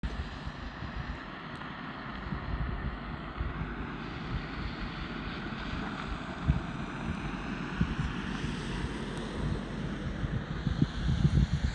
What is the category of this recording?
Soundscapes > Urban